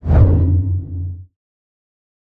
Sound effects > Other
Sound Design Elements Whoosh SFX 046
effects, elements, film, trailer, production, fx, audio, fast, whoosh, design, ambient, movement, effect, swoosh, sound, element, cinematic, transition, dynamic, sweeping, motion